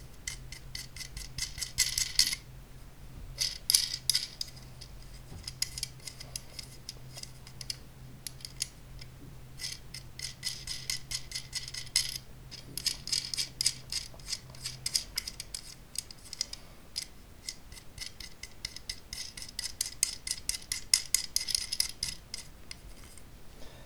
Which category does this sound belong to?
Soundscapes > Indoors